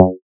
Instrument samples > Synths / Electronic
DUCKPLUCK 4 Gb
additive-synthesis, bass, fm-synthesis